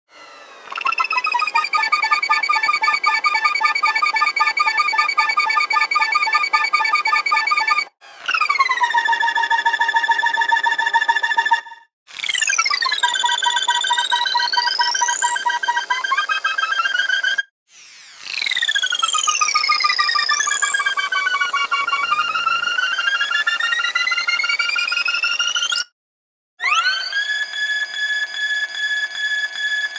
Sound effects > Electronic / Design
UIMisc Onboard Targeting Computer, Target Lock Alert, Starfighter Computer x5
onboard targeting computer / target lock for starship computer alerts made with sampletoy iOS app. mixed and mastered in ableton.
alert, computer, film, game, lock, post, scifi, ship, starship, target, tracker, UCS, UI